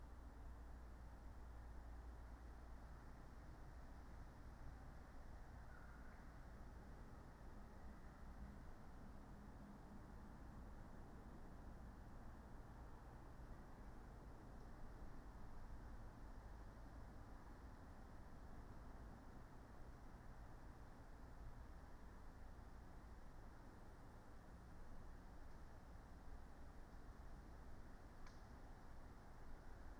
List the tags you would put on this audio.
Soundscapes > Nature
data-to-sound alice-holt-forest artistic-intervention nature phenological-recording modified-soundscape weather-data Dendrophone raspberry-pi sound-installation field-recording soundscape natural-soundscape

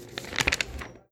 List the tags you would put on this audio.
Sound effects > Objects / House appliances
foley page

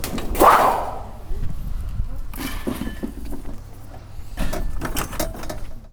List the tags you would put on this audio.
Sound effects > Objects / House appliances
Ambience Atmosphere Bang Bash Clang Clank Dump dumping dumpster Environment Foley FX garbage Junk Junkyard Machine Metal Metallic Perc Percussion rattle Robot Robotic rubbish scrape SFX Smash trash tube waste

